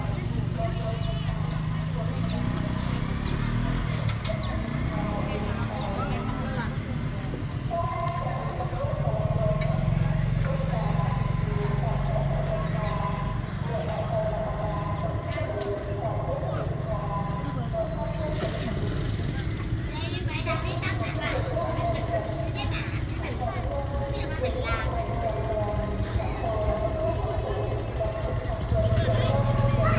Soundscapes > Urban
Street Ambience, Bak Peng, Thailand (March 20, 2019)
Ambient recording of street life in Bak Peng, Thailand, from March 20, 2019. Features local activity, people, motorbikes, and typical urban sounds.